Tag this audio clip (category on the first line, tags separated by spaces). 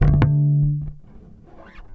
Instrument samples > String
fx loop mellow bass rock riffs oneshots pluck slide plucked funk loops electric charvel blues